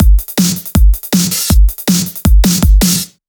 Music > Solo percussion
Synth Drum Loop 160 BPM
Short drum loop
Drum, Loop, Synth